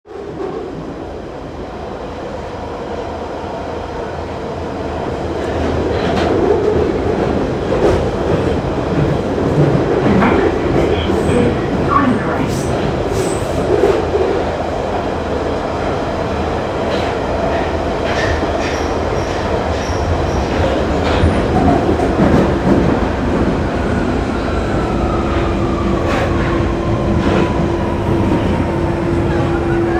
Soundscapes > Urban
London Underground last Train, Bethnal Green Area, UK
Recording from a late night tube train on the London Underground. Somewhere in the Bethnal Green Area, London. UK. 12/12/25
tube, mechanical, Underground, metro, loud, train, Londonunderground